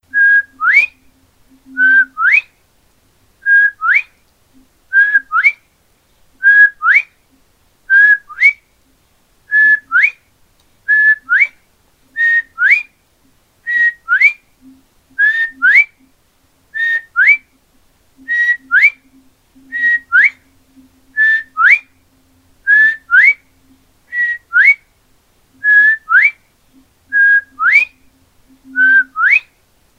Sound effects > Animals
A bobwhite. Human imitation.